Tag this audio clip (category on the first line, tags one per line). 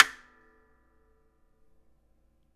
Sound effects > Objects / House appliances
glass
bonk
object
perc